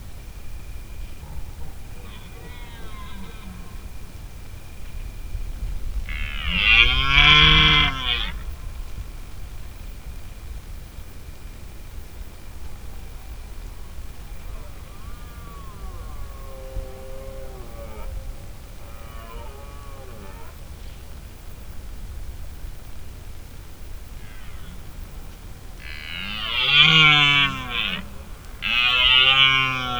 Sound effects > Animals
250910 22h43 Gergueil D104 - Brame
Subject : Stag bellowing in Gergueil. Sennheiser MKE600 with stock windcover. P48, no filter. A manfroto monopod was used. Weather : Processing : Trimmed and normalised in Audacity. Notes : Electric poles were close. So there's a slight buzz :/ Other spots we tried didn't have much activity or too much wind.